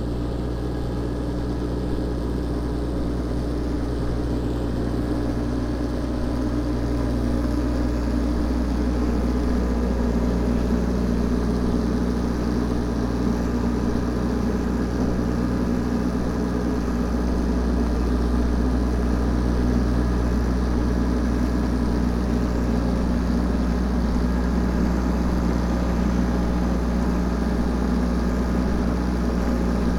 Sound effects > Vehicles
2025 06 28 Albi Pause guitare - Telescopic forklift carrying barriers - follow MKE600 vs NT5
Subject : Walking behind a telescopic fork life carrying barriers. Date YMD : 2025 June 28 Location : Albi Pratesgraussales 81000 Tarn Occitanie France. Hardware : Tascam FR-AV2 MKE600 on left channel, Rode NT5 on right channel. Weather : Sunny and very hot (38°c during the day) 40% humidity, little to no wind.
Outdoor, Tascam, France, june, forklift, motor, NT5, shotgun-mic, Occitanie, handler, barriers, 81000, Tarn, hypercadrioid, construction, MKE600, telescopic, FR-AV2, Rode, Sennheiser, pause-guitare, Albi, telescopic-handler, 2025